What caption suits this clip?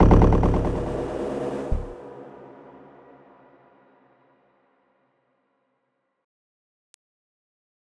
Instrument samples > Synths / Electronic
Deep Pads and Ambient Tones24
Chill, Tones, Pad, bassy, Synth, bass, Tone, Deep, Dark